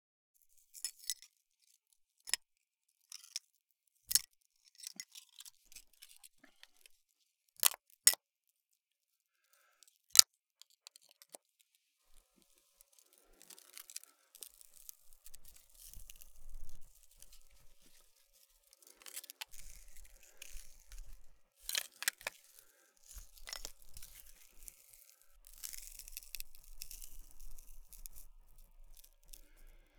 Sound effects > Other mechanisms, engines, machines
The sound of thin glass plates pressing and grinding against one another. Made by taking the glass plates off of several small lanterns, squeezing them together, and rubbing them around. Could be useful for any manner of ice, freezing, snow, or petrification effects. Apologies for the breathing present in some of this audio, but there should be enough clean stuff here to use in some original SFX mixing.